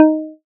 Instrument samples > Synths / Electronic
APLUCK 2 Eb
additive-synthesis, fm-synthesis, pluck